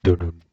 Sound effects > Electronic / Design
"Dudun" failure sound
A "dudun" sound which can represent some kind of failure or error. Recorded with my voice, then pitched and slowed down.